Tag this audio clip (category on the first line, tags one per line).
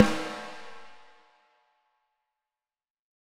Music > Solo percussion
acoustic
beat
brass
crack
drum
drumkit
drums
flam
fx
hit
hits
kit
ludwig
oneshot
perc
percussion
processed
realdrum
realdrums
reverb
rim
rimshot
rimshots
roll
sfx
snare
snaredrum
snareroll
snares